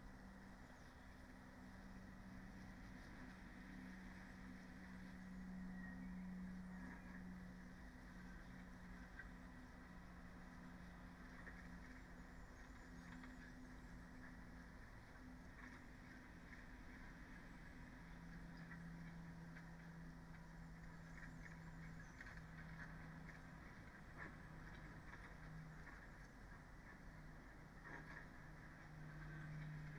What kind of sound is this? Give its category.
Soundscapes > Nature